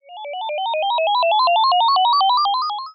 Sound effects > Other
battle win
47 - Winning a battle Synthesized using ChipTone, edited in ProTools
battle, condition, win